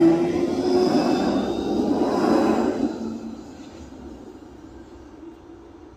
Soundscapes > Urban
final tram 4
hervanta, finland